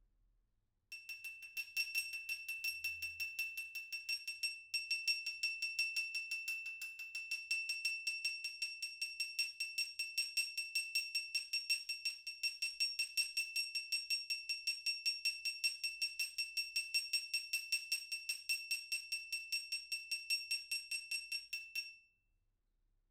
Sound effects > Other
Glass applause 3
Tascam, indoor, wine-glass, XY, solo-crowd, NT5, individual, glass, Rode, stemware, applause, FR-AV2, person, single, clinging